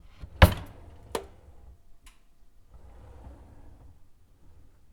Sound effects > Objects / House appliances
Rolling Drawer 03
drawer, dresser